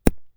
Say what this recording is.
Sound effects > Other
(possibly placing something down) another another hit bruh
recording of me flicking my mic